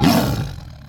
Sound effects > Animals
Tiger roar female
From a video were i recorded an angry female tiger. Isolated from that video.
beast, creatures, creepy, growl, Tiger